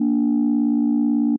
Instrument samples > Synths / Electronic
Landline Phonelike Synth A#4
Landline-Telephone-like-Sound, Tone-Plus-386c, Landline-Phone, Synth, Holding-Tone, Old-School-Telephone, just-minor-third, Landline-Phonelike-Synth, Landline-Holding-Tone, Landline, JI